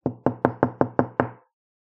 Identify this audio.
Sound effects > Human sounds and actions
Tapping a finger on the table
The normal sound of a knuckle rapping on a table. Recorded on a Galaxy Grand Prime.